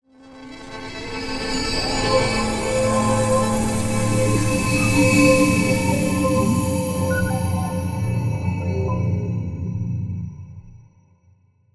Sound effects > Experimental
Magical Mystery Spell Burst
A deeply layered magical spell fx created from many layers of digital and analog synths. The sound of illusion, mystery, fantasy, sparkles, whimsical and wispy, fade in and fade out. Would sound good in a movie or videogame or even as a drop for some DJ production work. Enjoy~